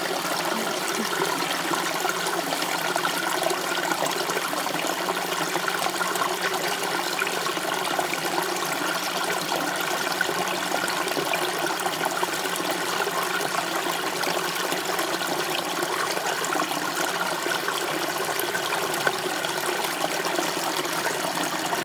Sound effects > Natural elements and explosions
Water flowing in a small creek outside Sound is my own, recorded on an iPhone 12